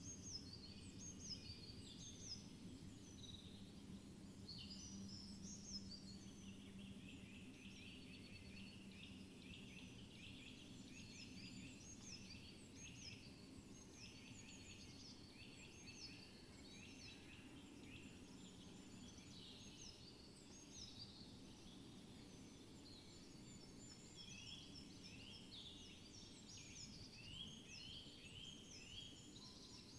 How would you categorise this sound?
Soundscapes > Nature